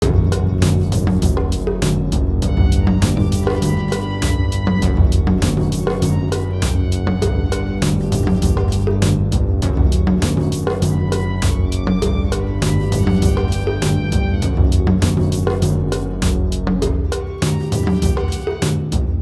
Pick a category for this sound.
Music > Multiple instruments